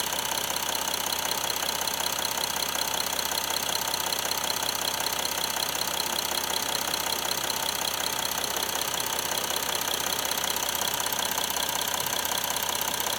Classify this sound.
Sound effects > Vehicles